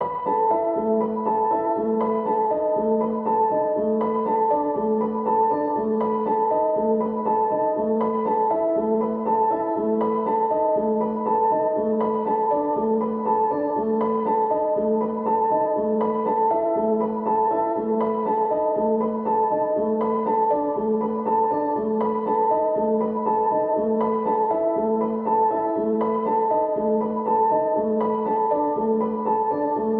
Music > Solo instrument
Piano loops 178 efect 4 octave long loop 120 bpm
pianomusic, music, piano, 120, free, simplesamples, reverb, loop, 120bpm, simple, samples